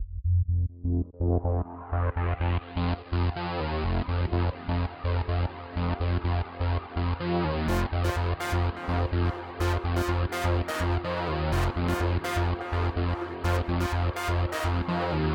Music > Multiple instruments
Fragment of an unfinished song with the drums removed. Use for whatever you feel like.